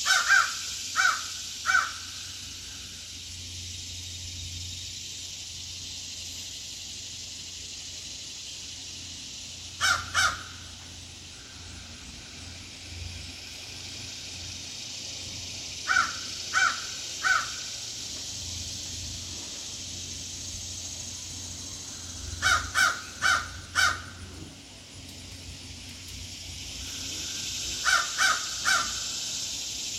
Sound effects > Animals
BIRDCrow-Samsung Galaxy Smartphone, CU and Distant American, Cawing, Faint Cicada Hiss Nicholas Judy TDC
American crows cawing up close and distant with faint cicada hiss.
distant, caw